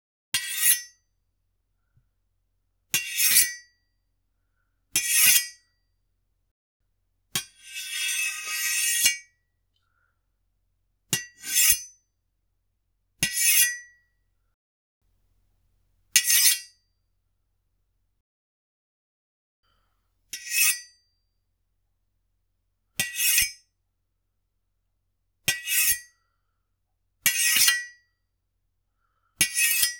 Sound effects > Objects / House appliances
sword small blade slides sound 09202025
slide sword sound inspired by tmnt 2012 or fate stay night heaven feel. recorded from a cleaver slide on a metallic cleaver's flat body.
attack, battle, blade, combat, demonslayer, duel, fate, fight, fighting, karate, katana, knife, kung-fu, light, martialarts, medieval, melee, metal, ninjutsu, samurai, scrape, slide, sword, swords, weapon, weapons